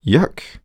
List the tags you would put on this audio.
Speech > Solo speech
dialogue
disappoined
disgusted
displeasure
dissapointed
FR-AV2
Human
Male
Man
Mid-20s
Neumann
NPC
oneshot
singletake
Single-take
talk
Tascam
U67
Video-game
Vocal
voice
Voice-acting
Yuck

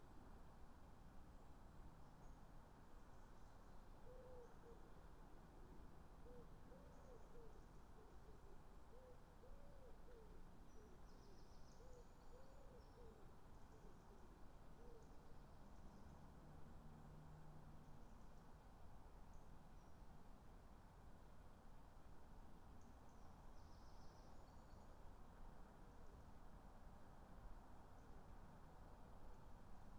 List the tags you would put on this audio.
Soundscapes > Nature
artistic-intervention
natural-soundscape

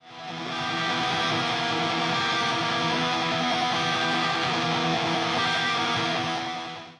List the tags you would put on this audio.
Music > Other
depressive
electric
guitar
sample

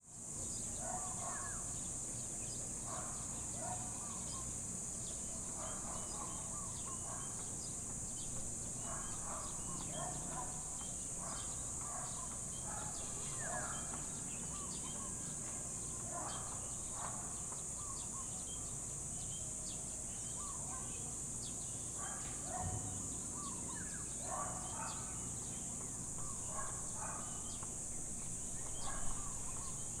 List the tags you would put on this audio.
Soundscapes > Urban
barking
calm
dogs
atmosphere
residential
birds
traffic
Philippines
suburban
soundscape
cicadas
morning
Calapan-city
ambience
field-recording
day
chirping
general-noise

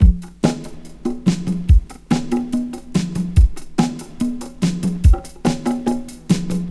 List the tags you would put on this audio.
Music > Multiple instruments
beat
drums
dreak
loop
80s
1lovewav

